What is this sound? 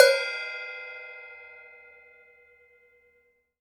Music > Solo instrument
Vintage Custom 14 inch Hi Hat-012
Cymbal oneshot from a collection of cymbal drum percussion pack recorded with Sure microphones and reaper. Processed with Izotope RX Spectral denoise
Custom, Cymbal, Cymbals, Drum, Drums, Hat, Hats, HiHat, Kit, Metal, Oneshot, Perc, Percussion, Vintage